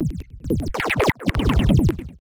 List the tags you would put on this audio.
Sound effects > Electronic / Design
Effect
Digital

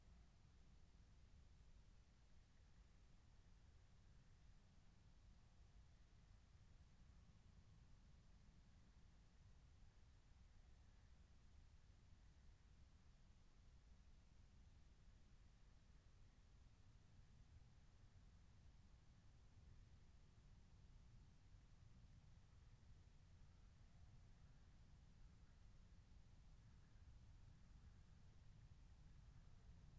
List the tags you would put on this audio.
Soundscapes > Nature
field-recording
soundscape
raspberry-pi
phenological-recording
alice-holt-forest
nature
natural-soundscape
meadow

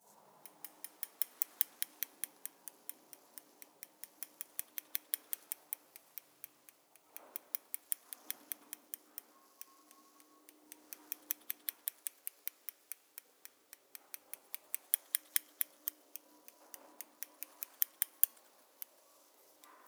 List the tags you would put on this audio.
Sound effects > Objects / House appliances
household
perc
metal
fx
scissor
foley
snip
scissors
cut
sfx
scrape
slice
tools

